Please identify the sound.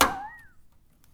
Sound effects > Other mechanisms, engines, machines
Handsaw Pitched Tone Twang Metal Foley 21
twangy, saw, perc, plank, fx